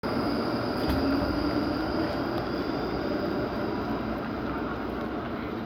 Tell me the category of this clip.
Soundscapes > Urban